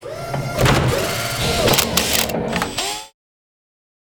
Sound effects > Other mechanisms, engines, machines
Mechanical Sound Design Elements-Robot PS 011
Mechanical Sound Design Elements-Robot SFX ,is perfect for cinematic uses,video games. Effects recorded from the field.
crackle, trembling, beeping, design, cracking, horror, engine, dramatic, robot, industrial, clicking, grinds, vibrations, buzz, sci-fi, machine, ringing, futuristic, door, creaking, mechanical, metal, strokes, steampunk, rumble